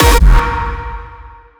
Instrument samples > Percussion
PVC Kick 3
Used Grvkicks and a Chromo Kick from FLstudio original sample pack. I used Plasma to boost 200-400 HZ to make it sounds digital and plastical. Cunchy Bass with a Grvkick added waveshaper and Fruity Limiter. And rumble used ZL EQ, Fruity Reeverb 2 and added waveshaper and Fruity Limiter too. The reversed gate noise parallelly conneted with dry sounds of its TOC, and just used Fruity Reeverb 2 and ZL EQ to make it screeching. Well altough it sounds bad, but I think can use it standard stage of hardstyle producing.
Distortion, GatedKick, Hardstyle, Kick, PvcKick, Rawstyle